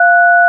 Sound effects > Electronic / Design
This is the number 3 in DTMF This is also apart of the pack 'DTMF tones 0-9'
dtmf, retro, telephone